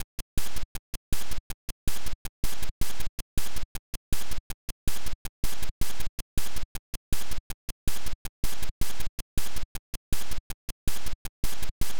Music > Solo percussion
160bpm retro game square wave drums
160 bpm, made in FL Studio. Simple retro game style drum loop.
160bpm, beat, drum, drum-loop, drums, game, loop, percussion, quantized, retro, rhythm, square-wave, squarewave, video, video-game